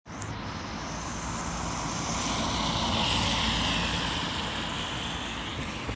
Sound effects > Vehicles
A car passing by from distance near insinnöörinkatu 60 road, Hervanta aera. Recorded in November's afternoon with iphone 15 pro max. Road is wet.